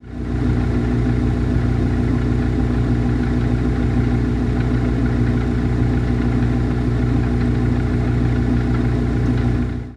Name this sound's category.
Sound effects > Other mechanisms, engines, machines